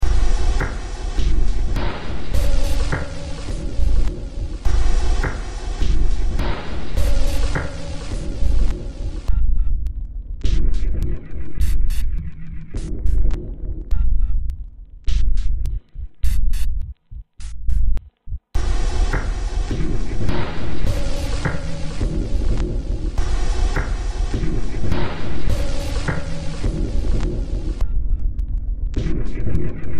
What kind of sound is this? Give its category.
Music > Multiple instruments